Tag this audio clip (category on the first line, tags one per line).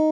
Instrument samples > String
arpeggio
cheap
design
guitar
sound
stratocaster
tone